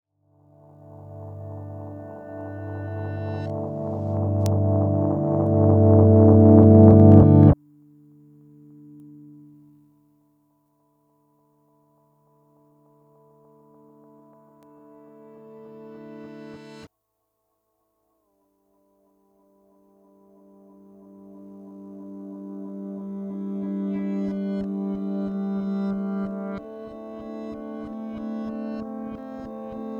Music > Other
cinematic reversing riser to sudden stop + drone
Cinematic reversing of delay sounds. Once the long reversing riser comes to a stop, a bunch of drone follows. Made with a Boss DM-2 pedal in FL Studio.
reversing, drone, reverse, sample, DM-2, cinematic, reversal, space